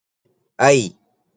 Sound effects > Other
ya-sisme
arabic, male, sound, vocal, voice